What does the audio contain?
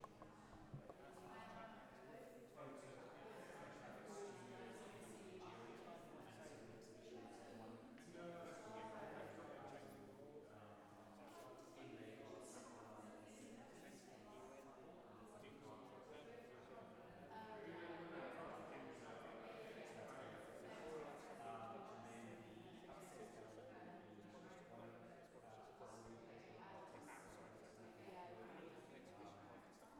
Soundscapes > Indoors
LNDN SOUNDS 008
Some snippets of talking recorded in an art gallery in central London. lots of overlapping echoing voices, mostly indiscernable. Unprocessed sound, captured with a Zoom H6
ambient, english, indoors, london